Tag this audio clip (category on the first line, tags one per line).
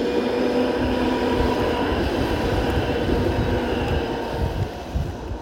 Soundscapes > Urban
vehicle
tampere
tram